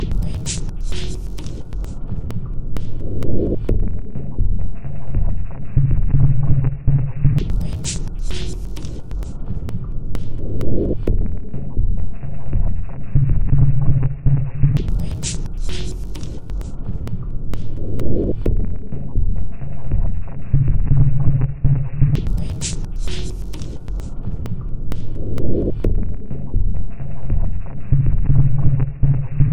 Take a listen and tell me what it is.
Instrument samples > Percussion
This 65bpm Drum Loop is good for composing Industrial/Electronic/Ambient songs or using as soundtrack to a sci-fi/suspense/horror indie game or short film.
Alien, Loopable, Dark, Soundtrack, Loop, Industrial, Underground, Ambient, Samples, Drum, Packs, Weird